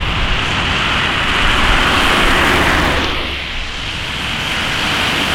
Sound effects > Vehicles
Car00062808CarMultiplePassing
rainy, automobile, car, field-recording, vehicle